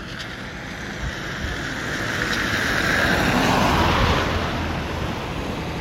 Soundscapes > Urban
auto12 copy
car, vehicle